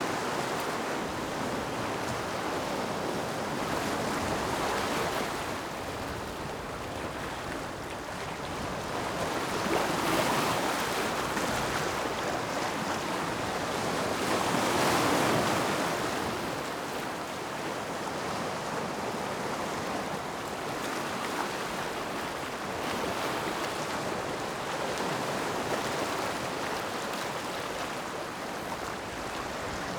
Soundscapes > Nature
Ocean waves on the beach. Recorded on the Isle of Man, Glen Maye Beach. Recorded with Rode NTG5, Tascam DR40.